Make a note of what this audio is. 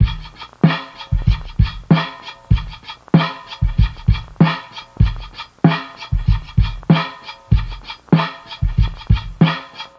Music > Solo percussion
bb drum break loop blat 96
Dusty, Lo-Fi, Acoustic, Drum, Breakbeat, DrumLoop, Drums, Drum-Set, Vintage, Vinyl, 96BPM, Break